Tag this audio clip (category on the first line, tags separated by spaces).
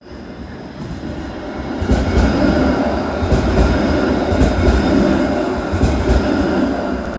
Sound effects > Vehicles
tampere tram